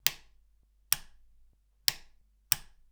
Sound effects > Objects / House appliances
Flipping Light Switch 3
The sound of a light switch being flicked on and off. Recorded with a 1st Generation DJI Mic and Processed with ocenAudio